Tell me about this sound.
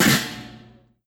Sound effects > Objects / House appliances
A charcoal grill lid close.